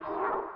Soundscapes > Synthetic / Artificial
LFO Birdsong 24
birds, lfo, massive